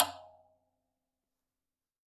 Music > Solo instrument
Rim Hit Perc Oneshot-005
Recording from my studio with a custom Sonor Force 3007 Kit, toms, kick and Cymbals in this pack. Recorded with Tascam D-05 and Process with Reaper and Izotope
Crash, Cymbals, Drums, FX, GONG, Hat, Kit, Oneshot, Paiste, Ride, Sabian